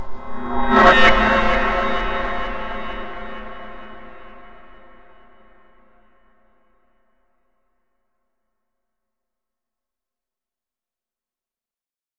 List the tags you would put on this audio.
Sound effects > Electronic / Design
foley horror scary sound

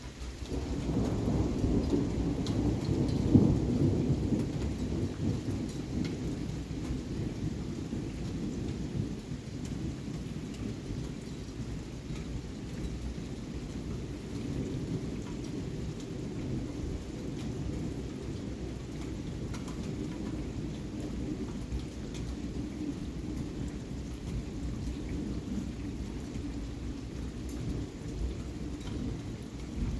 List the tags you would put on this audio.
Sound effects > Natural elements and explosions
Phone-recording loud rumble thunder boom

